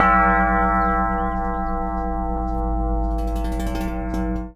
Sound effects > Objects / House appliances
Junkyard Foley and FX Percs (Metal, Clanks, Scrapes, Bangs, Scrap, and Machines) 102
Perc, Dump, Robotic, Clang, Smash, waste, garbage, Robot, rubbish, Metallic, Ambience, Percussion, FX, Junkyard, Metal, tube, scrape